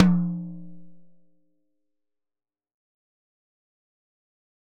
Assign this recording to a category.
Music > Solo percussion